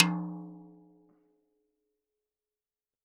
Music > Solo percussion
Hi Tom- Oneshots - 8- 10 inch by 8 inch Sonor Force 3007 Maple Rack
acoustic, beat, beatloop, beats, drum, drumkit, drums, fill, flam, hi-tom, hitom, instrument, kit, oneshot, perc, percs, percussion, rim, rimshot, roll, studio, tom, tomdrum, toms, velocity